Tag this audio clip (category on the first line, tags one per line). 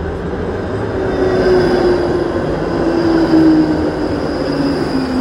Sound effects > Vehicles
urban
Tram